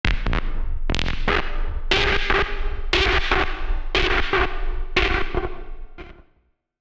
Sound effects > Electronic / Design
Optical Theremin 6 Osc ball infiltrated-013
noisey, DIY, Bass, Otherworldly, Trippy, Handmadeelectronic, Instrument, Infiltrator, Electronic, SFX, Analog, Spacey, Sweep, Glitch, Robot, Experimental, Synth, Digital, Sci-fi, Theremin, Alien, Noise, Scifi, Robotic, Electro, Optical, FX, Glitchy, Theremins, Dub